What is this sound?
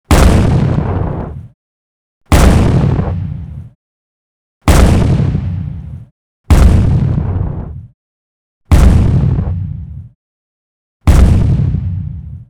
Sound effects > Natural elements and explosions
custom explosion detonation impact sound heavens feel 12082025
sounds of small detonating explosion sounds can be used when big hulking giants grab a huge building ripped apart.
fate, action, grenade, bomb, boom, anime, catastrophic, cannon, military, artillery, detonate, explosive, staynight, howitzer, projectile, mine, explosion, conflict, war, shot, attack, cataclysm, detonation, bam, big